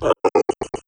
Sound effects > Electronic / Design
Strange Ability Effect
Random (chaos button) Effectrix effects used (X-Loop being the basis).
ability, abstract, dnd, dungeons-and-dragons, effect, fantasy, fun, funny, game, game-design, gaming, jester, magic, magical, magician, prank, prankster, RPG, sci-fi, sorcerer, sorcery, sound-design, spell, strange, video-game, vst, weird